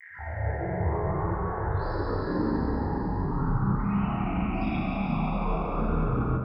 Soundscapes > Synthetic / Artificial
cave noise
Used Surge Synth XT to make a sine wave synth patch with maximum voices and voice detune, then used a lot of reverb and speeding up / slowing down some samples I made with this to generate these cave sounding noises